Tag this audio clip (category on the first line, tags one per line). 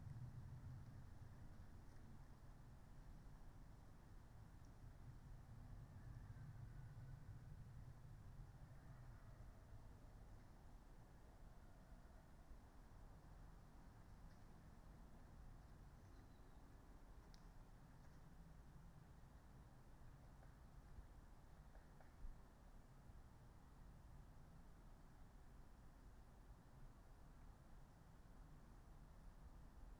Soundscapes > Nature
nature
alice-holt-forest
Dendrophone
artistic-intervention
phenological-recording
soundscape
natural-soundscape
raspberry-pi
sound-installation
weather-data
field-recording
data-to-sound
modified-soundscape